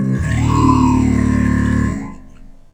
Sound effects > Experimental
Creature Monster Alien Vocal FX-50
gutteral, demon, Monstrous, Growl, Echo, Snarl, Sound, sfx, Sounddesign, devil, boss, Animal, Ominous, gamedesign, scary, Reverberating, Deep, fx, Vocal, visceral, Snarling, Monster, evil, Otherworldly, Vox, Alien, Fantasy, Frightening, Creature, Groan